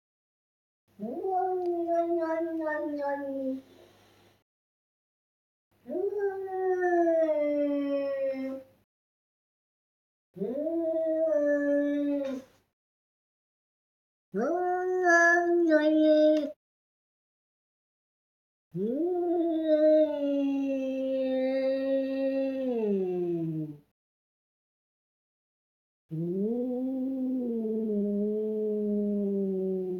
Sound effects > Animals
Cat yowling - distant then close-up - Russian Blue cat, male
A dozen takes. Quiet interior without resonance.